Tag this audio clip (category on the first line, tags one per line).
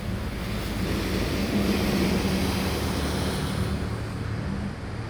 Sound effects > Vehicles
engine
vehicle
bus